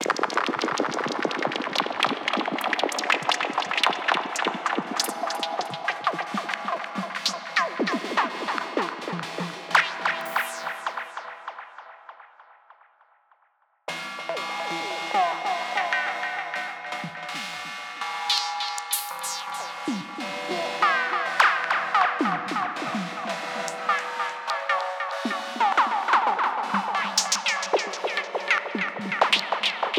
Sound effects > Electronic / Design
A collection of atmospheric FX sounds — evolving textures, rising swells, and trippy transitions crafted for deep psytrance spaces. Includes multiple variations for layering and movement at 147 BPM, E major — heavily distorted, so results may vary!
effect, fx, nightpsy, psy, psyhedelic, sfx, sound, sounddesign, soundeffect, strange, trance